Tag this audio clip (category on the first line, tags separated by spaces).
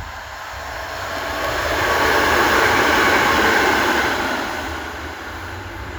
Soundscapes > Urban
Drive-by field-recording